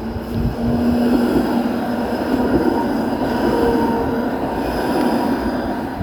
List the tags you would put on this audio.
Sound effects > Vehicles
embedded-track passing-by Tampere tram

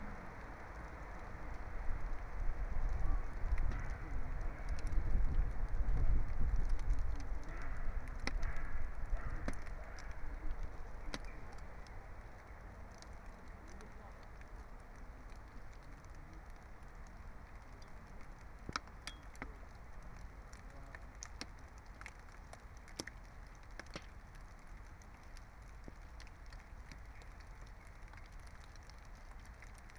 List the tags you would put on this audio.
Soundscapes > Nature
field-recording phenological-recording meadow raspberry-pi alice-holt-forest natural-soundscape nature soundscape

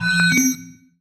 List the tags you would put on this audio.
Sound effects > Electronic / Design
interface confirmation

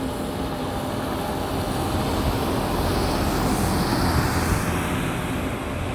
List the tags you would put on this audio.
Sound effects > Vehicles
engine bus vehicle

